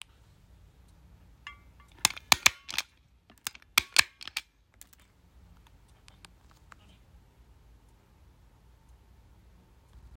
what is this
Sound effects > Human sounds and actions
A stapler for when you need it.